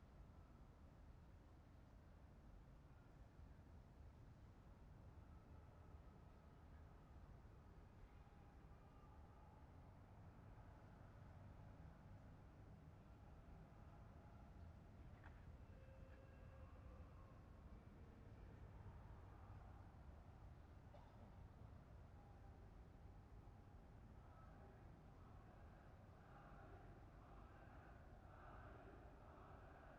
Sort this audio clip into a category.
Soundscapes > Urban